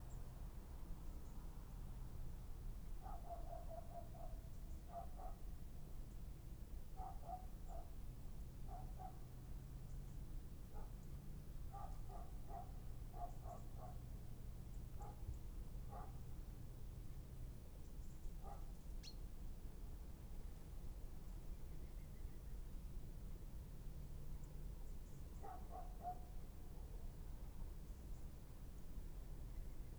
Soundscapes > Urban
Quinzano Verona Distant amb1
The distant rumble of Verona, Italy, recorded from the heights of Quinzano hills in the afternoon of the january 1st. Low roar of the city, a few birds, dogs, some firecrackers. Wide AB omni stereo, recorded with 2 x EM272 Micbooster microphones & Tascam FR-AV2
distant, verona